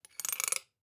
Sound effects > Other mechanisms, engines, machines
Metal Scrape 02
sample, metal, noise, scape